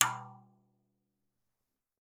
Music > Solo instrument
Rim Hit Perc Oneshot-006
Crash, Custom, Cymbal, Cymbals, Drum, Drums, FX, GONG, Hat, Kit, Metal, Oneshot, Paiste, Perc, Percussion, Ride, Sabian